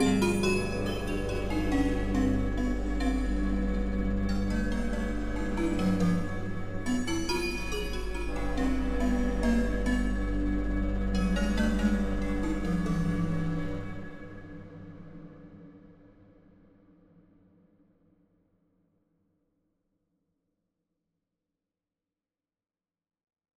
Music > Multiple instruments
Plasmonic No Face Esk Creep Tones
A weird lil key loop I created with FL Studio, Chromaphone, and some other drone Synths. Processed with Reaper